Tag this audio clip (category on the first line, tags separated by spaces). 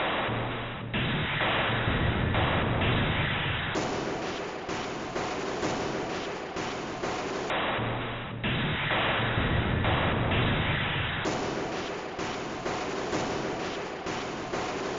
Instrument samples > Percussion
Loopable Ambient Underground